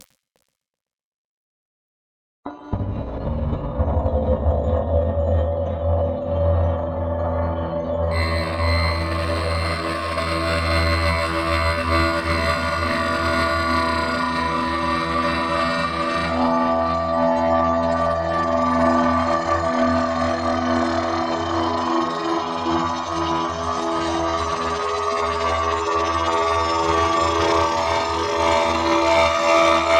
Soundscapes > Synthetic / Artificial
ambient drone landscape texture alien evolving experimental atmosphere dark shimmer shimmering glitchy glitch long low rumble sfx fx bass bassy synthetic effect ambience slow shifting wind howl roar
low, drone, dark, synthetic, ambient, landscape, shimmering, bass, long, evolving, wind, effect, texture, glitchy, sfx, atmosphere, shimmer, ambience, experimental, fx, roar, slow, rumble, shifting, howl, alien, bassy, glitch